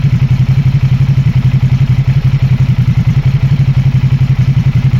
Sound effects > Other mechanisms, engines, machines
puhelin clip prätkä (3)
Description (Motorcycle) "Motorcycle Idling: distinctive clicking of desmodromic valves, moving pistons, rhythmic thumping exhaust. High-detail engine textures recorded from close proximity. Captured with a OnePlus Nord 3 in Klaukkala. The motorcycle recorded was a Ducati Supersport 2019."
Ducati, Motorcycle